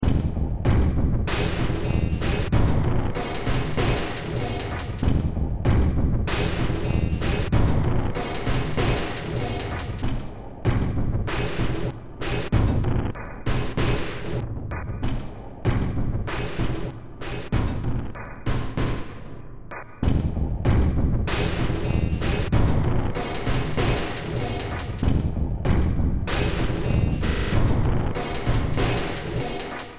Music > Multiple instruments
Demo Track #3853 (Industraumatic)
Games, Industrial, Horror, Soundtrack, Ambient, Noise, Sci-fi, Cyberpunk, Underground